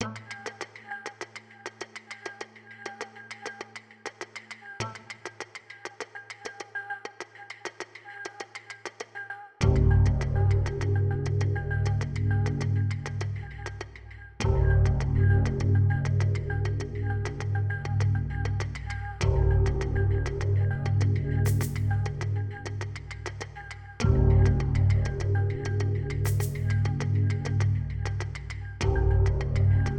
Music > Other
Impending Doom
This minimal, moody piece builds a tense atmosphere through sparse, percussive textures and eerie silence. The lack of harmonic resolution keeps the listener in a state of unease, making it ideal for a suspenseful sequence—a slow chase, a dark revelation, or the moments just before something breaks. It doesn’t demand attention, but it tightens the air around the scene, letting tension breathe and coil.
filmmusic, minimal, percussive, Soundtrack, suspense, tension